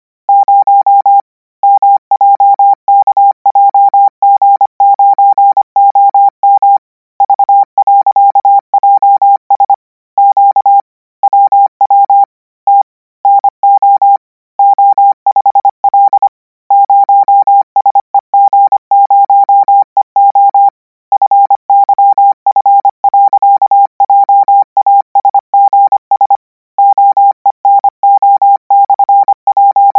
Sound effects > Electronic / Design
Koch 25 KMRSUAPTLOWI.NJEF0YVGS/Q9 - 700 N 25WPM 800Hz 90%
Practice hear characters 'KMRSUAPTLOWI.NJEF0YVGS/Q9' use Koch method (after can hear charaters correct 90%, add 1 new character), 700 word random length, 25 word/minute, 800 Hz, 90% volume. Code: 0 mjkjg9om v.js q ww t no o5l 0seg0eo fyf.jasgs oeno/j/o pt/i9km gy oi r j siit m/ m u. ivq9yk j qvtwvoww0 9aq/lgr tl tv0t .l9ma /.ty gpfiaq upplyn yqnnaoke u5tswe/o. rq.kof e r e/uswijir 0ktg.9v/i 9inrgpowa fqw/ 5oyon0se krq/ oqsgja0g amp59f rj /k/es jpfns 9mq/0ajn5 j yegfv q0.gtyo 9rnr eag qksnq rrgvq ewi /lfe0ejva vgmfomve 0ey .jgkua .u5a n/iostakw wifkrl gauokunt0 .nwost/jw gnf0 rlkuy mmq. um 5akieijq awek pvqiuk qvv90 uqoev00 os5o.u/ u/.mq aq ayjp. iio tmve 9n/n0v0 fakjaanq ljv/.al5o 9aliu ly rwev0 lq/qq peaur. k t9 e.pj59 kigvp auisu/.vu qf qn 9ftj/qw s .kt j.9m akur /k a ./rupf asu jfnqi wws 0sovol/ nkv.r v5u 9lnemm .uk/oa efim l nltr. ql mw5ek ffi/y oqr9jnr qnupglg tu lffkff0 rjseqs09 tria00 .
characters, code, codigo, morse, radio